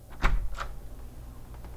Sound effects > Objects / House appliances
Door Open 02
door, house